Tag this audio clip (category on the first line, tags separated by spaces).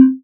Instrument samples > Synths / Electronic
additive-synthesis bass